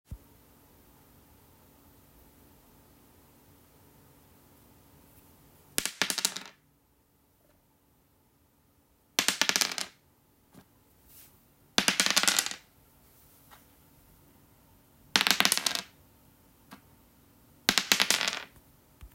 Sound effects > Objects / House appliances
Rolling die
Rolling a die on a wooden floor a couple of times. I left some space at the beginning so that people can do noise cancellation
board-game, wooden-floor